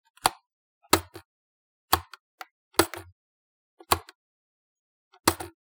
Sound effects > Objects / House appliances
Kettle Button Pressing
The sound of a button on a kettle being pushed down and back up. Recorded with a 1st Generation DJI Mic and Processed with ocenAudio